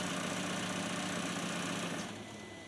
Sound effects > Vehicles
Citroen C4 engine idling and shutting off. Recorded with my phone.
turn-off, car, motor, shut-off, automobile, idling